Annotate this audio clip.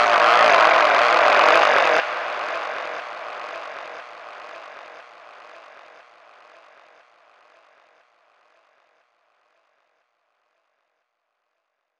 Speech > Other
car exhaust sound
pain, agony